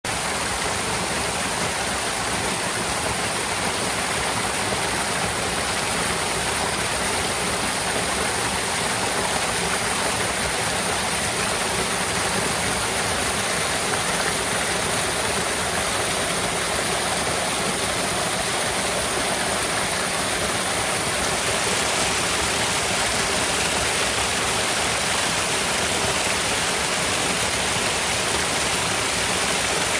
Soundscapes > Nature
This sound was captured in one of the rivers in Trevejo, Spain.